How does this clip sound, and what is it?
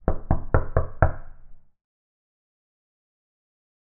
Sound effects > Human sounds and actions
Another door knock for this site. Made for a play that got cut.